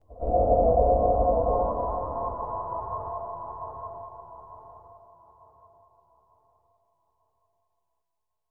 Sound effects > Other
Mysterious, Weird, Wind, Drone, Cave, Whistle, Darkness, Ghost, Haunted, Spirit
Ghost, Haunted, Mysterious, Spirit, Weird, Whistle